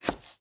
Sound effects > Other
Hard chop vegetable 5
Potato being cut with a santoku knife in a small kitchen.
Chop, Slice, Cook, Cut, Household, House, Knife, Vegetable, Indoors, Chief, Kitchen, Cooking